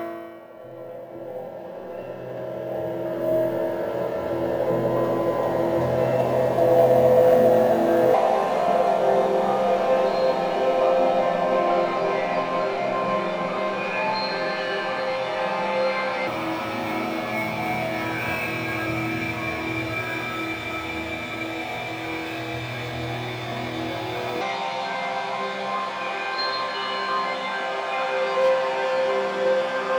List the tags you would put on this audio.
Soundscapes > Synthetic / Artificial
sfx experimental dark slow wind texture glitch synthetic low roar rumble landscape evolving fx bassy ambient shimmer alien glitchy shimmering howl shifting bass effect atmosphere long ambience drone

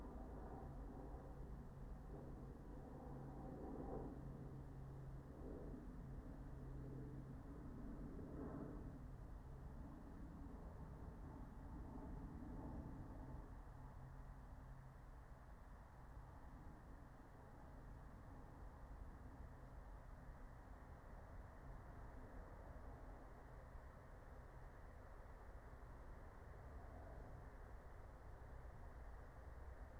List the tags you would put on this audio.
Soundscapes > Nature
nature alice-holt-forest meadow natural-soundscape field-recording raspberry-pi soundscape phenological-recording

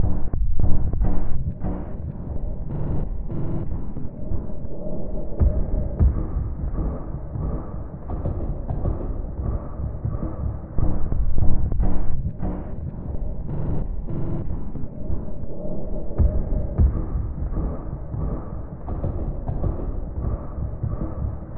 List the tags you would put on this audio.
Soundscapes > Synthetic / Artificial
Alien Ambient Dark Drum Industrial Loop Loopable Packs Samples Soundtrack Underground Weird

